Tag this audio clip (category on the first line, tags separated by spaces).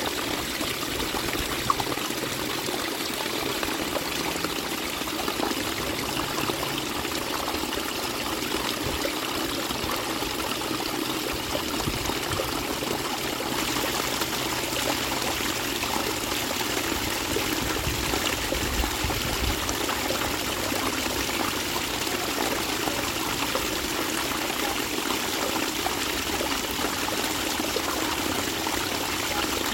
Sound effects > Natural elements and explosions
loop Phone-recording rapid rapids rushing water